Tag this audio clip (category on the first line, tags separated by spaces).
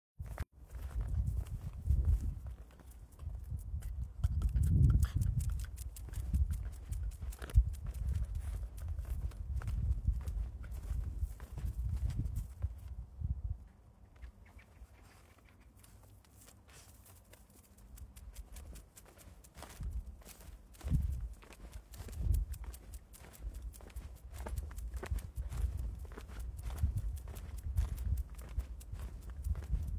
Soundscapes > Nature
birds dog nature walk